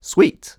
Speech > Solo speech
Joyful - Sweet 3
Video-game, U67, sweet, joy, oneshot, Vocal, happy, Man, Mid-20s, word, Male, FR-AV2